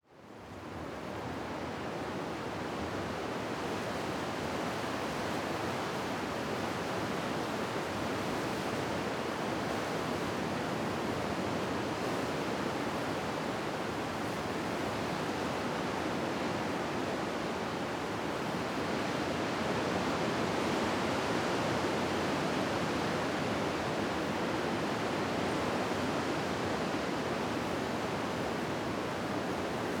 Soundscapes > Nature
garden strong wind ile callot
generlae ambiance of wnd in vegetation, big trees and shrubs.
field-recording, garden, gusts, nature, outside, trees, wind